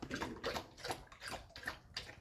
Sound effects > Animals
FOODDrnk Dog Lapping Water Nicholas Judy TDC
A dog lapping water.